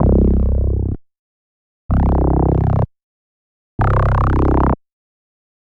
Instrument samples > Synths / Electronic
VSTi Elektrostudio (Model Mini)